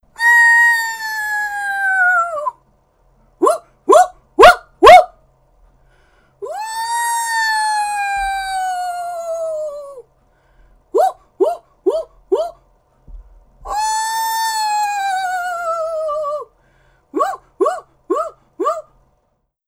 Sound effects > Animals
TOONAnml-Blue Snowball Microphone, CU Owl, Screech, Human Imitation Nicholas Judy TDC
A screech owl. Human imitation.
bird, Blue-brand, Blue-Snowball, cartoon, evil, fantasy, halloween, horror, human, imitation, macbeth, mystery, owl, scary, screech